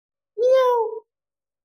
Sound effects > Human sounds and actions
sound cat soft meow
Cat sound mimicked by a human being.
cats, Domestic, Cat, Shelter, Voice, Pet, Kibble, Natural, Kitty, Young, Kitten, Pets, animals, Sounds, Nature, sound, Animal